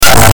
Instrument samples > Synths / Electronic
This is my second sound so far, also made with one of harha's kicks.
Hardcore, Harsh